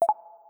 Sound effects > Electronic / Design
Simple or Cute UI / UX / Interface Confirm sound
Sound I made for my game - good for interface actions: confirm, yes, press, click Base sound made with the sfxr plugin and then edited in audacity.
Confirm, Interface, Generic, UI, Cute, UX